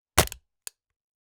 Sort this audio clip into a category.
Sound effects > Objects / House appliances